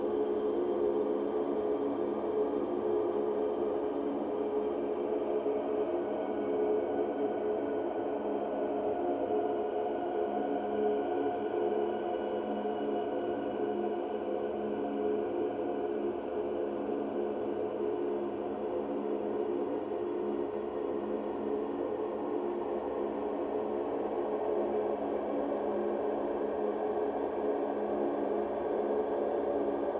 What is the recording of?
Soundscapes > Synthetic / Artificial
Horror Atmosphere 15 Impending Loop
in-danger, Seamless-loop, high-pitched, thriller, ominous, Horror-Atmosphere, somethings-wrong, Horror, impending-doom, tension, Horror-Ambience, Dark-Atmosphere, Dark-Ambience